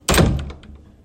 Sound effects > Objects / House appliances
Door close sound
A short sound file made at my place of work of me slamming the door.
close, d, doors, slam